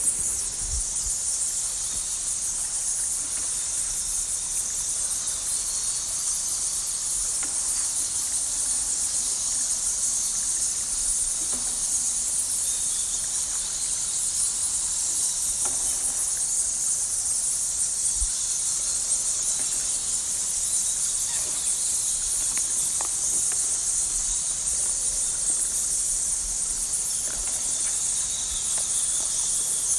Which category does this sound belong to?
Soundscapes > Nature